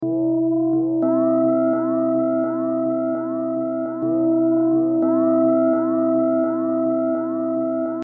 Sound effects > Electronic / Design
Sci-Fi/Horror Alarm 5
Sounds dystopian, like something from Half-Life 2.
eerie, horror, fx, hl2, sci-fi, alert, half-life, warning, alarm, effect, space